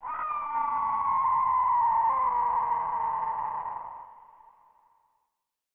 Sound effects > Electronic / Design
Banshee Wail (distant)
banshee, banshee-cry, banshee-roar, banshee-roaring, banshee-wail, banshee-wailing, banshee-yell, creepy-scream, hag-scream, hag-wailing, high-pitched-wail, horrifying-cry, horrifying-scream, horror-cry, horror-scream, monster-cry, monster-scream, monstrous-wail, roar-banshee, roaring-banshee, scary-monster-cry, SilverIlusionist, spooky-cry, spooky-monster, spooky-monster-cry, spooky-scream, terrifying-scream, wailing-banshee, wailing-hag, wailing-monster